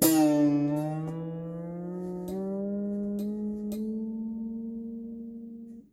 Sound effects > Other
TOONBoing-Samsung Galaxy Smartphone, CU Guitar, Boing, Up, Long Nicholas Judy TDC
A long guitar boing up.
boing, guitar, long